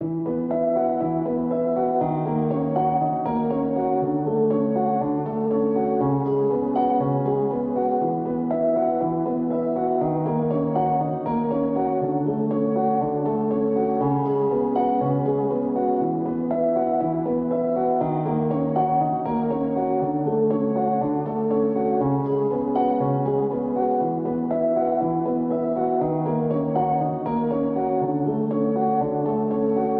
Music > Solo instrument
Piano loops 070 efect 4 octave long loop 120 bpm
samples, simple